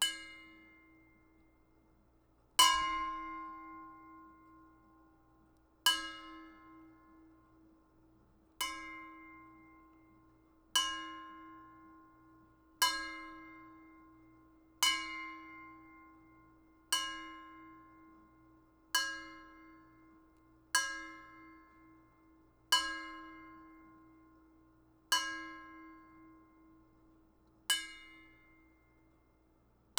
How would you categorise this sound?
Sound effects > Objects / House appliances